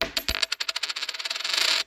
Sound effects > Objects / House appliances
OBJCoin-Samsung Galaxy Smartphone, CU Penny, Drop, Spin 09 Nicholas Judy TDC
drop, Phone-recording, spin, foley, penny